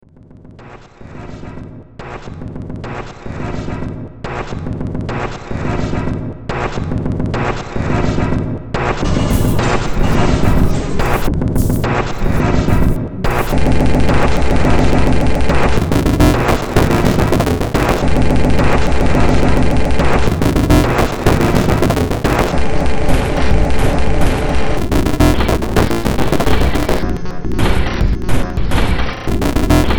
Music > Multiple instruments
Demo Track #3453 (Industraumatic)
Ambient, Cyberpunk, Games, Horror, Industrial, Noise, Sci-fi, Soundtrack, Underground